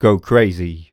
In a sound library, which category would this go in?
Speech > Solo speech